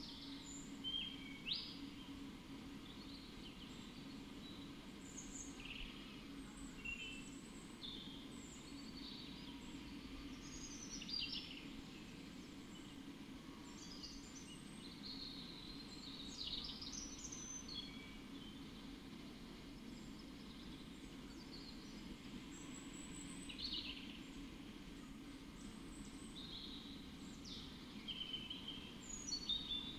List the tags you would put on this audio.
Soundscapes > Nature
alice-holt-forest
artistic-intervention
data-to-sound
Dendrophone
modified-soundscape
nature
phenological-recording
raspberry-pi
sound-installation
soundscape
weather-data

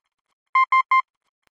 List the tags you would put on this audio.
Sound effects > Electronic / Design
Language
Morse
Telegragh

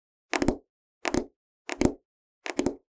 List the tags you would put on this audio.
Sound effects > Human sounds and actions
tapping
tap
thumping

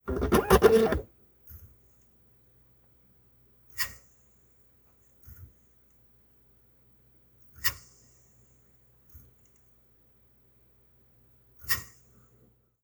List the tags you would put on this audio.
Sound effects > Other mechanisms, engines, machines
motor
machine

